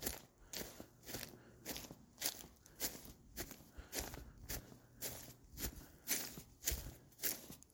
Sound effects > Human sounds and actions
FEETHmn-MCU Footsteps, On Grass Nicholas Judy TDC
Footsteps on grass.